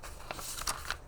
Sound effects > Objects / House appliances
OBJBook-Blue Snowball Microphone Comic Book, Page, Turn 02 Nicholas Judy TDC
Turning a page of a comic book.
Blue-brand, Blue-Snowball